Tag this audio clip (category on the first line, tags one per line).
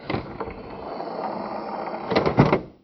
Sound effects > Other mechanisms, engines, machines
close
closing
door
pneumatic
processed
sfx
slide